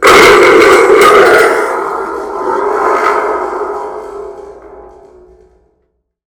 Music > Solo percussion
MUSCPerc-Blue Snowball Microphone, CU Thunder Tube, Huge, Loud, Strike Nicholas Judy TDC
Huge, loud thunder tube strike.
Blue-brand, Blue-Snowball, cartoon, huge, loud, strike, theatrical, thunder-tube